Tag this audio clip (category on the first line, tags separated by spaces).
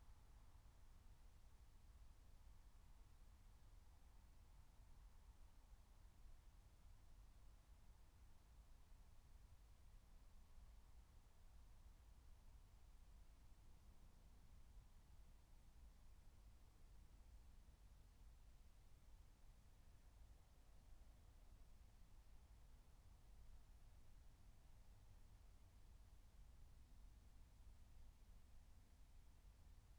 Soundscapes > Nature
field-recording raspberry-pi phenological-recording